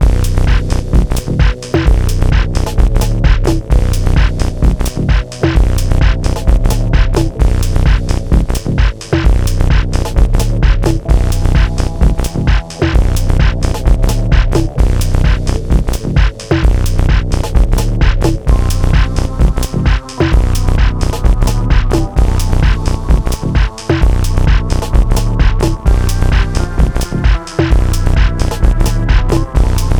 Music > Multiple instruments
Raw Tech Style loop Idea 130BPM
Jungle Tech idea made in FL11